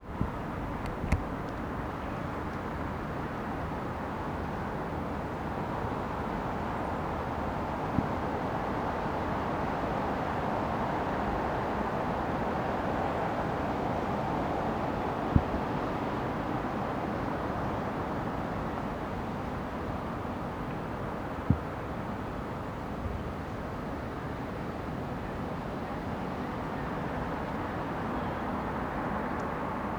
Soundscapes > Urban
AMBUrbn-XY Zoom H4e City center in the evening SoAM Sound of Solid and Gaseous Pt 1 Calmly evening
street, street-noise, traffic